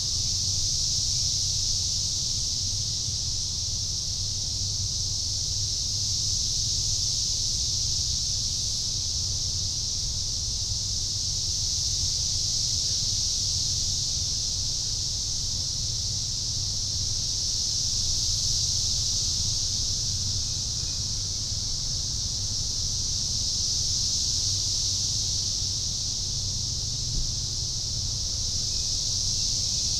Soundscapes > Nature
Mid-afternoon Cicadas, Bernheim Forest, Shepherdsville, Kentucky.